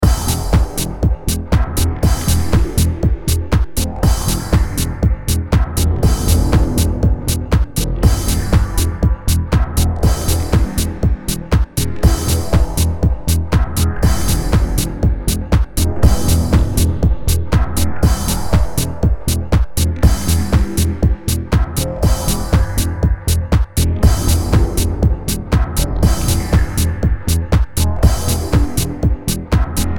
Music > Multiple instruments
Made in FL11